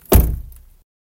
Sound effects > Objects / House appliances
Car Door Shutting
Thanks. i’ll make it a little scavenger hunt for me
automobile, car, metal, vehicle, effect, shut, impact, sound, slam, close, door